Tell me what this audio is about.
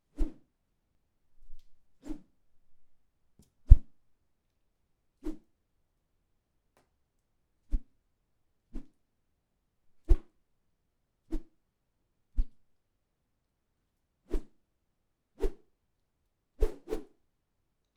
Sound effects > Natural elements and explosions
Stick - Whooshes (Multi-take 3)
Subject : A whoosh sound made by swinging a stick. Recorded with the mic facing up, and swinging above it. Date YMD : 2025 04 21 Location : Gergueil France. Hardware : Tascam FR-AV2, Rode NT5. Weather : Processing : Trimmed and Normalized in Audacity. Fade in/out.